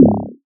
Instrument samples > Synths / Electronic
BWOW 2 Db
additive-synthesis, fm-synthesis